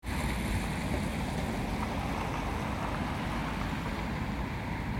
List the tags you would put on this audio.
Sound effects > Vehicles
auto car city field-recording street traffic

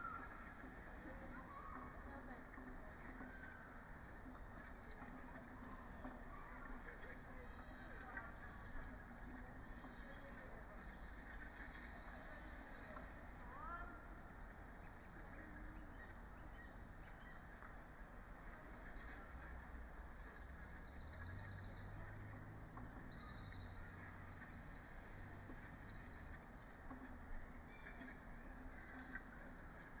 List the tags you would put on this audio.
Soundscapes > Nature
Dendrophone phenological-recording sound-installation raspberry-pi alice-holt-forest

